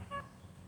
Sound effects > Animals
Primates - Ring-tail Lemur; Short Whimper
Recorded with an LG Stylus 2022 at Hope Ranch.
lemur, madagascar, primate, ring-tail, safari